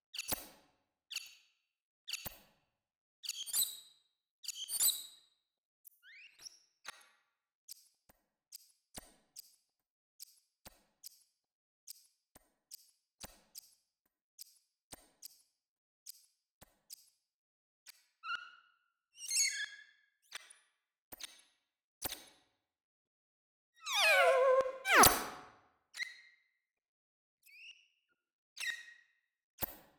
Sound effects > Electronic / Design
warped wave vox guac chirp
Sequences of glitchy alien tones and fx created with Wavewarper 2 and other vst effects in FL Studio and further processed with Reaper